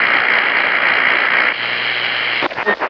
Sound effects > Objects / House appliances
COMRadio Static AM Transmission
direct input recording of me tuning the AM/FM radio on my urban outfitters ClearTech portable cassette player for this static-y radio transmission sound effect. REAL RADIO WAVES :0